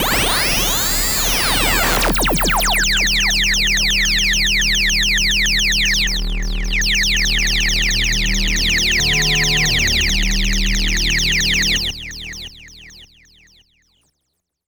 Sound effects > Experimental
Analog Bass, Sweeps, and FX-040
dark, basses, effect, synth, oneshot, electronic, trippy, analogue, sample, complex, fx, snythesizer, robotic, pad, weird, sweep, robot, scifi, bass, electro, vintage, sfx, bassy, machine, sci-fi, alien, retro, mechanical, analog, korg